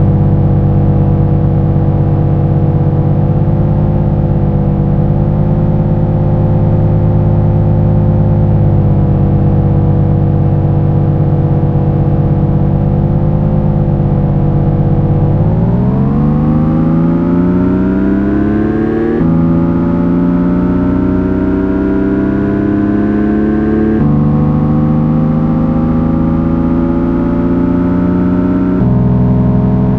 Sound effects > Vehicles
Mississauga Transit Orion V bus engine
Synthesized audio of the Detroit DIesel Series 50 engine and Allison B400R transmissions on the Mississauga Transit Orion V buses.
vehicle, dds50, allison, mississauga, bus, motor, synth, orion, engine, transmission, transit, detroit, diesel, miway, synthesized, truck